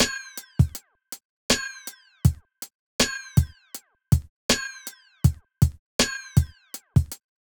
Music > Solo percussion
Hip Hop Drums Loop # 8
simple hip hop drum sound / 80 bpm
rap, improvised, drum, cleaner, percussive, sound, sonido, percussion-loop, loop, percussion, Bateria, hiphop, sandyrb, loops, 80-bpm, drum-loop, sample